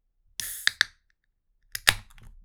Soundscapes > Other
Sound of opening a beer can. I used a Zoom H1n mounted on a mini tripod stand.